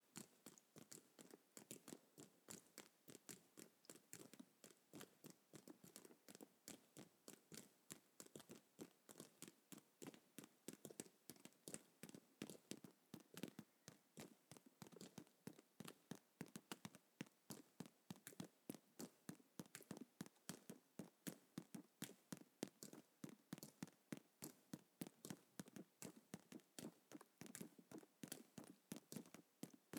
Sound effects > Natural elements and explosions
Rhythmic Rain Drops on Vegetation
studio recording of water dripping onto leaves, imitating the sound of rain. Stereo file, can be split into 2 mono tracks for different rhythms. or just as is with the nice polyrhythm that I got here.